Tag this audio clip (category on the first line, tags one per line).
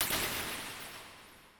Sound effects > Electronic / Design
energy
noise
sfx
laser
electric
abstract
sound-design